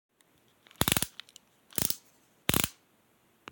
Sound effects > Other mechanisms, engines, machines
Ratchet/Mechanical Screwdriver cranking
Sound from a mechanical screwdriver action. Recorded with an LG Journey Phone